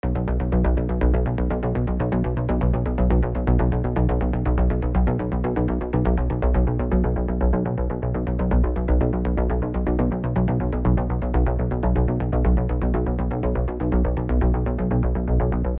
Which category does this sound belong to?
Music > Other